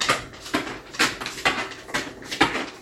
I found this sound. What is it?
Sound effects > Animals
FEETAnml-Samsung Galaxy Smartphone, CU Dog, Looped Nicholas Judy TDC
Dog footsteps. Looped.
dog, animal, foley, footsteps, loop, Phone-recording